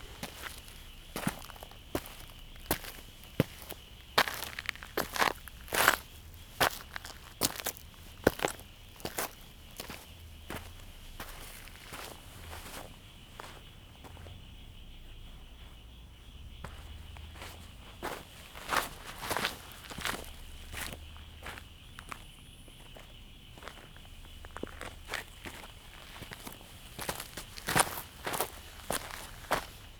Soundscapes > Other
Footsteps on Gravel Outside near lake. NSW, Australia
Outside, Gravel, Footsteps